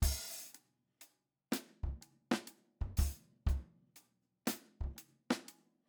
Music > Solo percussion
Short loop 61 BPM in 6 over 8
live, studio